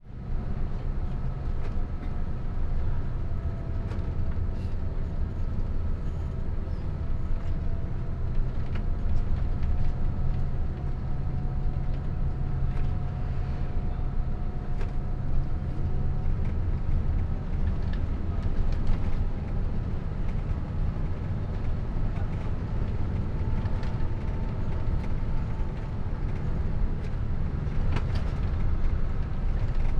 Sound effects > Vehicles
250808 160437-2 PH Travelling in the front of a bus
Travelling in the front of a bus. (Take 3) I made this recording while sitting in the front of a bus, just behind the driver, travelling from Manila to Palico, in the Philippines. Here, the road is quite bumpy sometimes. So, one can hear the atmosphere in the vehicle, with the engine, the bumps of the road, the driver honking sometimes, as well as some people talking and/or eventually using their mobile phones to watch some videos or make some video calls (but nothing disturbing), and the doors of the bus opening and closing when someone comes in or exits. Recorded in August 2025 with a Zoom H5studio (built-in XY microphones). Fade in/out applied in Audacity.
Philippines, bus, transportation, open, field-recording, atmosphere, horn, engine, noise, bump, travel, close, jolt, lurch, ambience, trip, voices, doors, road, soundscape, motor, people, vehicle